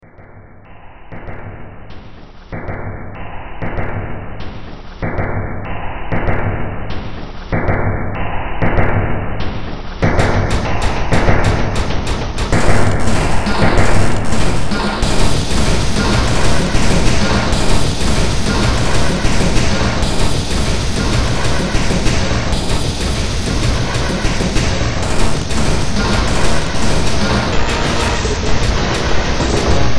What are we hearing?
Music > Multiple instruments
Demo Track #3992 (Industraumatic)
Soundtrack, Underground, Ambient, Horror, Games, Sci-fi, Noise, Industrial, Cyberpunk